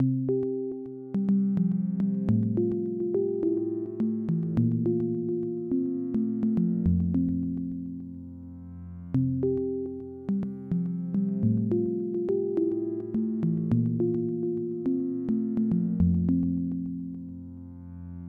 Music > Solo instrument
Incoming call ringtone in the style of early 2000s Frutiger aero. 105 bpm, made in FL Studio using FL BooBass, reverb, delay, EQ, and patcher. This is the stripped down, minimal version of the full ringtone which is in this sound pack.